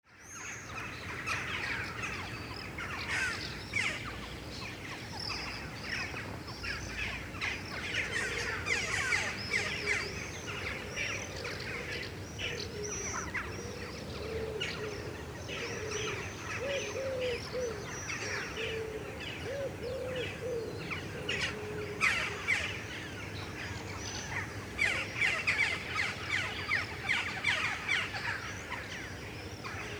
Soundscapes > Urban
Jackdaws, swifts, sparows pigeons and other birds at the evening in Rostrenen. Birds are flying aroud in a large place. Some cars and other anthropic sounds.